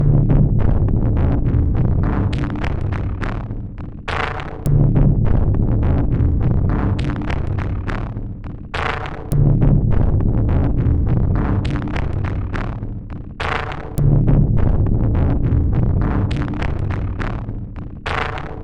Instrument samples > Percussion
This 103bpm Drum Loop is good for composing Industrial/Electronic/Ambient songs or using as soundtrack to a sci-fi/suspense/horror indie game or short film.
Underground, Loopable, Industrial, Samples, Drum, Ambient, Dark, Packs, Loop, Soundtrack, Alien, Weird